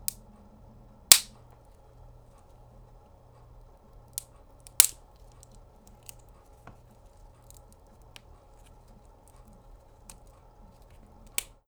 Sound effects > Objects / House appliances
WOODBrk-Blue Snowball Microphone, CU Branch, Snaps, Crackles Nicholas Judy TDC
A branch snapping and crackling.
Blue-brand, Blue-Snowball, branch, crackle, foley, snap